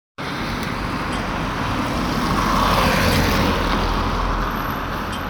Soundscapes > Urban
car sound
passing, tires